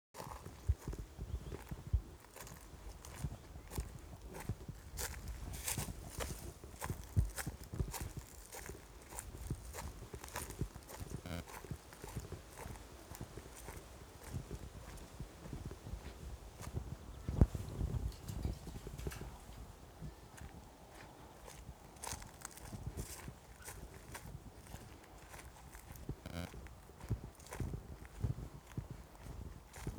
Soundscapes > Nature
Mildura - Walking crunchy
Soundscape recording from outback Australia - Mildura. Plane in distance. wind, very quiet recording.
Soundscape, Wind, Mildura